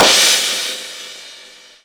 Instrument samples > Percussion
crash 1 abbreviated 1
A BELOVED TOP-TIER CRASH! Remind me to use it!
Avedis, bang, China, clang, clash, crack, crash, crunch, cymbal, Istanbul, low-pitched, Meinl, metal, metallic, multi-China, multicrash, Paiste, polycrash, Sabian, shimmer, sinocrash, sinocymbal, smash, Soultone, spock, Stagg, Zildjian, Zultan